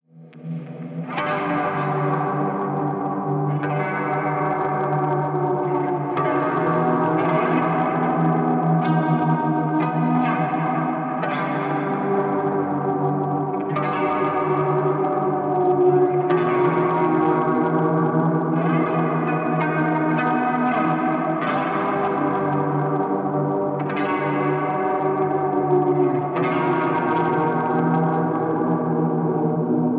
Music > Solo instrument
Here is a dreamy guitar sample that I record in my home studio having fun with a few guitar toys Guitars: Jazzmaster Fender Mexico, Faim Stratocaster (Argentina) pedalboard: Behringer graphic eq700 Cluster mask5 Nux Horse man Fugu3 Dédalo Electro Harmonix Keys9 Maquina del tiempo Dédalo Shimverb Mooer Larm Efectos Reverb Alu9 Dédalo Boss Phase Shifter Mvave cube baby 🔥This sample is free🔥👽 If you enjoy my work, consider showing your support by grabbing me a coffee (or two)!